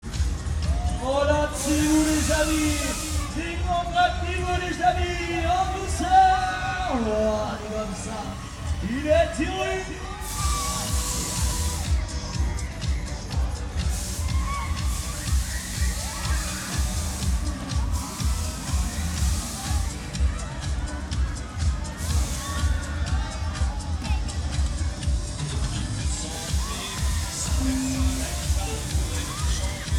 Soundscapes > Urban
Fun fair teillouse Redon
ambiance, amusement, attraction, fair, field-recording, France, French, fun-fair, music, people, Redon, teillouse, voice